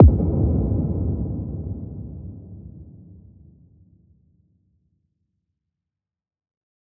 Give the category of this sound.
Instrument samples > Percussion